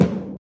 Instrument samples > Percussion
drum-002 mid tom
My sister's mid tom with damper rubber ring. And I've removed the reverb. This audio still has a slight sense of space, possibly due to the recording distance.
drums, percussive, one-shot